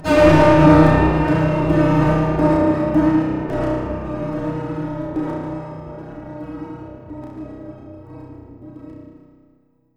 Sound effects > Electronic / Design
Horror Surprise
Press I to open the inventory BUT THERE IS A CREEPY FINGER SLIDING ACROSS THE SCREEN! Use WASD to move BUT A CORPSE FALLS FROM THE CEILING WHEN YOU TAKE THE FIRST STEP! Use the mouse to look around BUT IN THE SHADOW OF THE CORNER OF THE ROOM IS A SPIDER BABY WITH GLOWING RED EYES! Press space to jump. Created by layering multiple piano notes and drums at the same time in FL Studio and passing the output through Quadrant VST. This was made in a batch of 14, many of which were cleaned up (click removal, fading, levelling, normalization) where necessary in RX and Audacity: